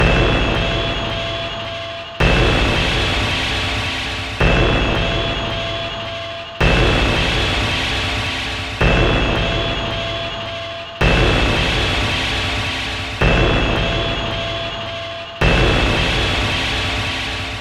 Instrument samples > Percussion
This 109bpm Drum Loop is good for composing Industrial/Electronic/Ambient songs or using as soundtrack to a sci-fi/suspense/horror indie game or short film.
Packs, Samples, Loopable, Dark, Weird, Ambient, Soundtrack, Underground, Industrial, Loop, Drum, Alien